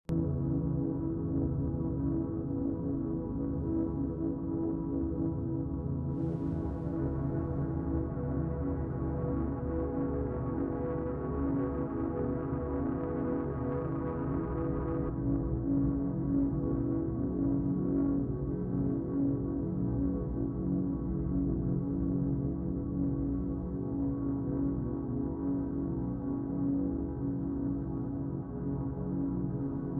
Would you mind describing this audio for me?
Music > Other
ribcage ambient wall
ambient wall of sound, forgot how i made this!
drone, ambient